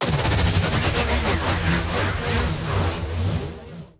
Sound effects > Electronic / Design
A synthetic designed impact hit created in Reaper with various plugins.